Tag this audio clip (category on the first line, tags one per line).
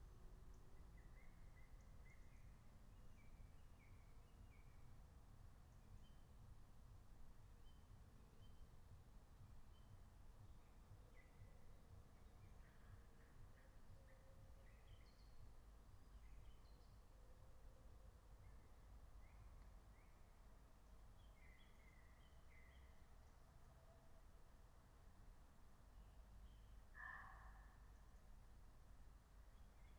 Soundscapes > Nature
alice-holt-forest
meadow
field-recording
nature
raspberry-pi
natural-soundscape
soundscape
phenological-recording